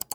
Sound effects > Objects / House appliances
Click Satisfying Mouse Retro Gaming
A recording my my Logitech G502 Hero clicking for utilization on my webpage.
mouse, press, switch, fancy, satisfying, button, click